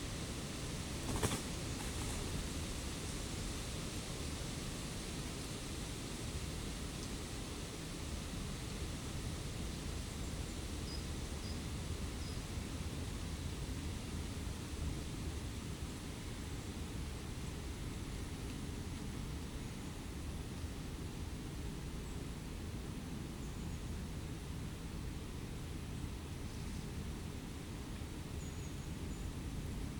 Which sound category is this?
Soundscapes > Nature